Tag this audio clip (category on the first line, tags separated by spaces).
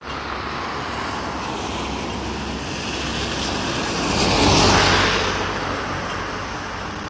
Sound effects > Vehicles
car road tire